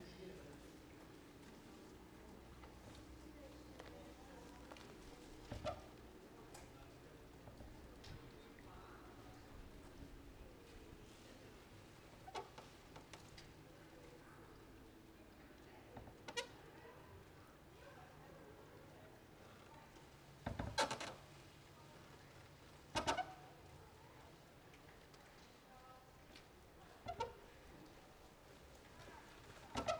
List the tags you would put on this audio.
Soundscapes > Urban
creak
hull
rope
small-boat
squeak
Venezia
Venice
Venise

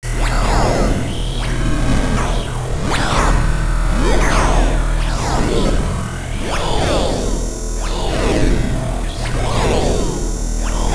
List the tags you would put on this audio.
Sound effects > Electronic / Design
Experimental
Trippy
Glitch
Creatures
Automata
Analog
Noise
Creature
Trippin
Droid
Buzz
Abstract
Robotic
Synthesis
Alien
Mechanical
Drone
Spacey
FX
Otherworldly
Neurosis
Digital

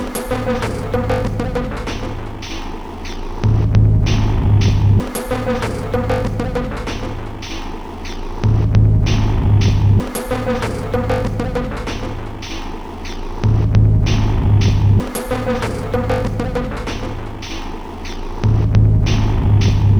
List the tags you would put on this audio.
Instrument samples > Percussion
Alien
Packs
Industrial
Loopable
Ambient
Dark
Soundtrack
Drum
Loop
Weird

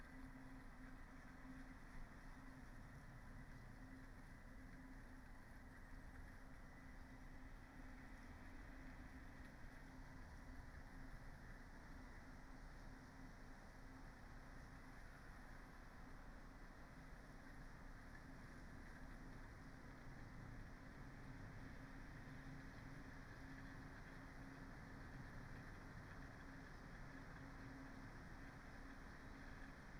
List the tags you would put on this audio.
Soundscapes > Nature
sound-installation
weather-data
phenological-recording
raspberry-pi
nature
soundscape
natural-soundscape
modified-soundscape
field-recording
alice-holt-forest
data-to-sound
Dendrophone
artistic-intervention

